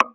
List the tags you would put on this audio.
Sound effects > Electronic / Design
game ui interface

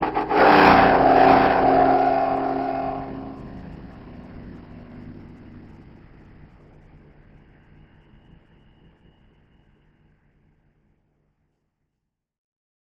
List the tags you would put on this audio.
Sound effects > Objects / House appliances
metal banging eerie scrape

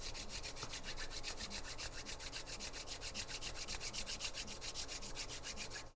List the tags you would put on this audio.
Sound effects > Human sounds and actions
forth back Blue-Snowball

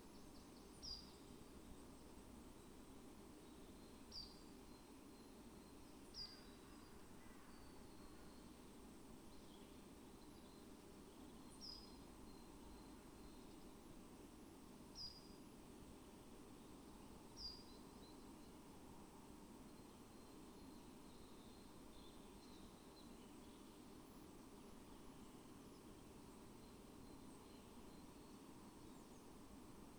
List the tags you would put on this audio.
Soundscapes > Nature
phenological-recording alice-holt-forest natural-soundscape modified-soundscape artistic-intervention Dendrophone data-to-sound field-recording weather-data sound-installation raspberry-pi soundscape nature

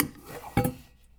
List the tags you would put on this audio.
Sound effects > Objects / House appliances
stab fx natural sfx percussion foley drill object perc industrial hit clunk glass fieldrecording mechanical foundobject metal oneshot bonk

